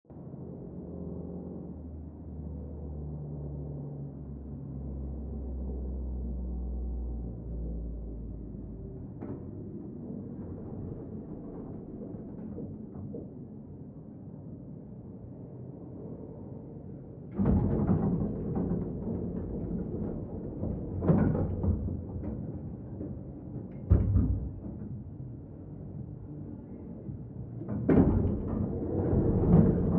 Soundscapes > Indoors
This a a soundscape I created for an escape game I work at using Commons 0 sounds from this very website. This soundscape simulates the noisy neighbors next door.
Neighbor, Steps